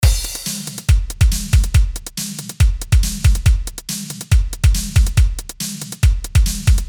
Music > Solo percussion
A drum loop with a synthwave/80's sound.